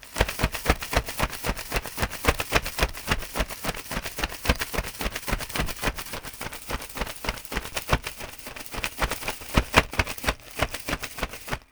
Sound effects > Animals
WINGMisc-CU Bat Wings, Flapping Nicholas Judy TDC
Bat wings flapping. Simulated by me using an umbrella to flap open and close rapidly.
bat, foley, simulation, wings